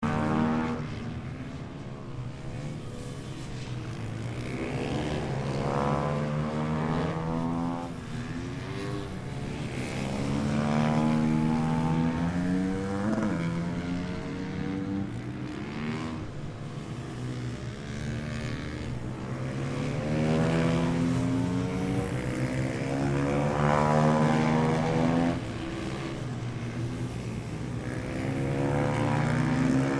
Soundscapes > Other
Supermoto Polish Championship - May 2025 - vol.1 - Racing Circuit "Slomczyn"
Recorded on TASCAM - DR-05X; Field recording on the Slomczyn racetrack near Warsaw, PL; Supermoto Championship;
supermoto, race, motorbikes, championship, racing, motorcycles, racetrack, bikes, high-speed